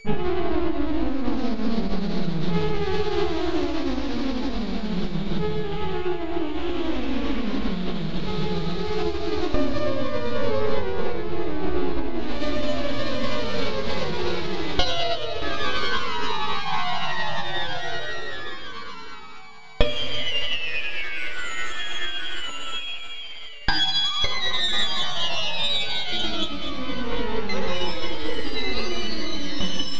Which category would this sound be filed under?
Sound effects > Electronic / Design